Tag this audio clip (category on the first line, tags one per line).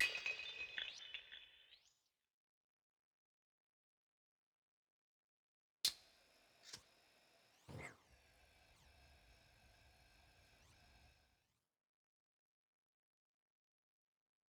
Sound effects > Electronic / Design
sound-design
breaking-fx
futuristic
gas
lighter